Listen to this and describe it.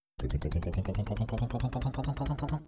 Sound effects > Experimental
Counting up
Created for the video game DystOcean, I made all sounds with my mouth + mixing.
Counting, voice, stairs, Increase